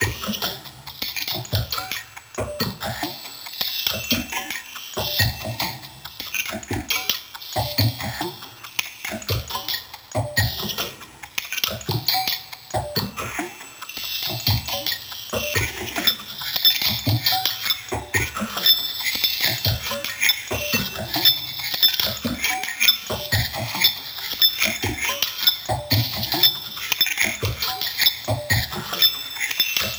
Music > Multiple instruments
Foley Drum Loop 1
An excerpt of a track I am working on, in which the drums present are heavily modulated variants of my foley; second of two drum loops. To clarify, all of the drums used are foley recorded by myself. The BPM of the loop is 139; the time signature is 3/4. This would be suitable for an eery-unsettling piece.
139-bpm, drums, eery, foley, percussion